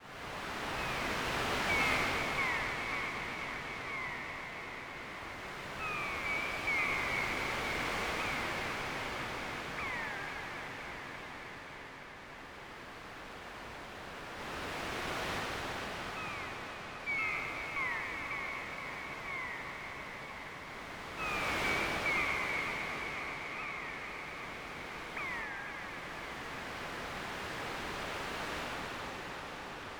Music > Solo instrument
117 - Scream Soundscape
this is a modified soundscape from absynth4 synthesizer. i have e played it in bitwig studio.
absynth4, ambient, background, birds, layered, nativeinstruments, soundscape, synthestic